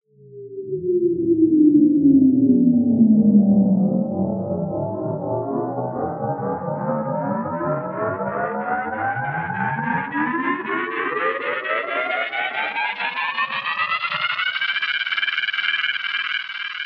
Sound effects > Electronic / Design
Whale/Aquatic Riser/Powerup - Variation 1
Made in LMMS and 3xOsc using a sh** ton of effects.